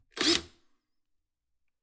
Sound effects > Other mechanisms, engines, machines
Milwaukee impact driver foley-011
Drill, Foley, fx, Household, Impact, Mechanical, Metallic, Motor, Scrape, sfx, Shop, Tool, Tools, Woodshop, Workshop